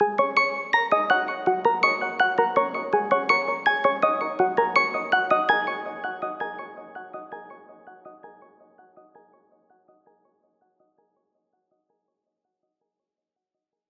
Music > Solo instrument
Melody Loop-Botanica Melody 3-82Bpm
A very easy melody that click midi board to get. Notes included: #G, #C, #D, #F, #A. Synthed with phaseplant only.
Melody, Botanica, 82bpm, Crystal, Loop, Lead